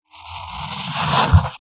Sound effects > Objects / House appliances
Fountainpen Draw 4 Riser
Drawing on notebook paper with an ink fountain pen, recorded with an AKG C414 XLII microphone.
drawing, fountain-pen, writing